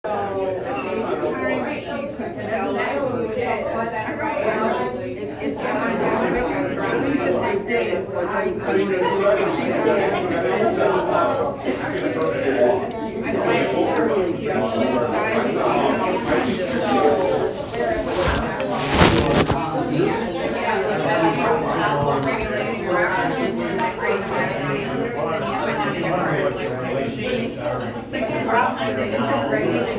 Speech > Conversation / Crowd
redneck breakfast
Morning breakfast at a local diner. Extremely loud and chaotic, dissonant tone.
cacaphony, chatter, crowd, crowded, din, field-recording, loud, noise, people, roar, talking, white, yakking